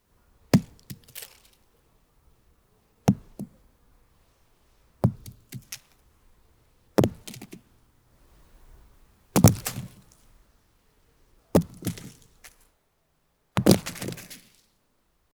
Sound effects > Natural elements and explosions
Falling cone to the ground